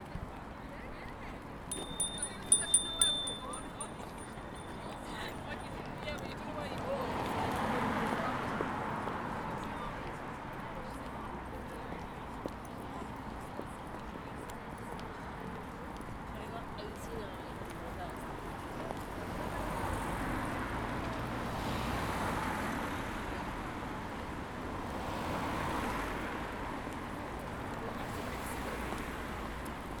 Soundscapes > Urban
newcastle quayside walk
Recording of a stroll down the quayside area of Newcastle-Upon-Tyne. 2019. Recorded on a Zoom H2n.
ambience, cars, city, footsteps, lighttraffic, newcastle, summer, uk, voices